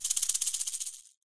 Instrument samples > Percussion
Dual shaker-016
Two shakers assembled by a wood handle were played to achieve some different dual-shaker transitions.
percusive, recording, sampling